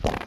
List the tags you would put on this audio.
Sound effects > Other
flatulence gas

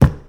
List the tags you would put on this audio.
Sound effects > Objects / House appliances
bucket carry clang clatter cleaning container debris drop fill foley garden handle hollow household kitchen knock lid liquid metal object pail plastic pour scoop shake slam spill tip tool water

